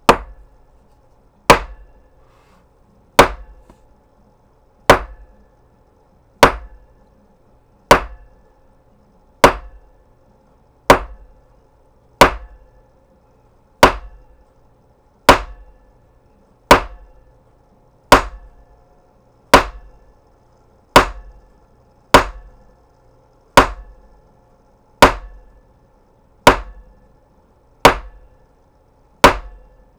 Sound effects > Objects / House appliances
OBJMisc-Blue Snowball Microphone, CU Gavel, Hits, Auction, Judge, Courtroom Nicholas Judy TDC
Blue-brand, courtroom, foley, gavel, hit, judge